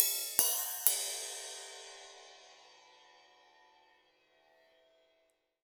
Music > Solo instrument
Cymbal Combination Multi-001
Hat, kit, Drums, Metallic, Drumkit, Crash, Drum, Ride, Metal, Cymbals, Cymbal, Perc, Percussion